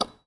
Instrument samples > Percussion
Palm Ball Ring Percussion Fence Sound - Nova Sound
Immerse yourself in the authentic ambiance of basketball and sports with over 700 meticulously crafted sound effects and percussion elements. From the rhythmic dribbles and graceful swishes to the electrifying shouts and spirited chants, this sound kit covers the full spectrum of the game. Feel the bounce. Hear the game.
Balling, basketball, Trap